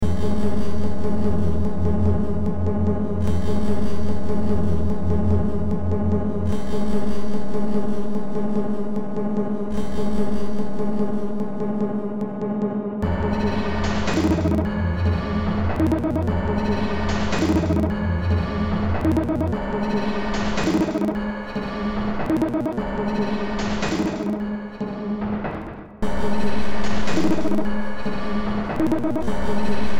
Music > Multiple instruments
Short Track #3669 (Industraumatic)
Horror, Industrial, Cyberpunk, Games, Noise, Soundtrack, Sci-fi, Underground, Ambient